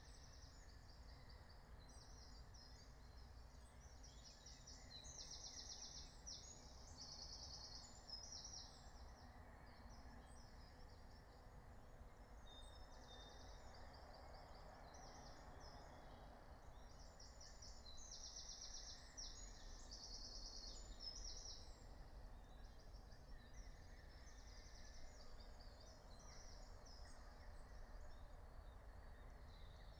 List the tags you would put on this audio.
Soundscapes > Nature
alice-holt-forest
meadow
field-recording
nature
soundscape
phenological-recording
natural-soundscape
raspberry-pi

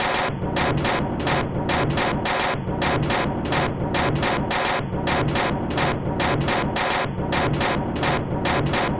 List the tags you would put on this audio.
Instrument samples > Percussion
Loop
Samples
Soundtrack
Loopable
Dark
Packs
Industrial
Drum
Ambient
Weird
Alien
Underground

